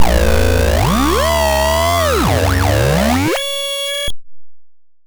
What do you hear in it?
Sound effects > Electronic / Design
Optical Theremin 6 Osc dry-087
Handmadeelectronic, Experimental, Theremin, Electronic, Noise, Glitchy, Optical, Sweep, Synth, Scifi, Robotic, noisey, Glitch, Trippy, Alien, Robot, Spacey, Electro, SFX, Analog, Digital, Infiltrator, Bass, Instrument, Theremins, DIY, FX, Sci-fi, Dub, Otherworldly